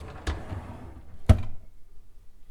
Sound effects > Objects / House appliances
Rolling Drawer 05
dresser, drawer